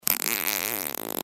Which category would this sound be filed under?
Sound effects > Other mechanisms, engines, machines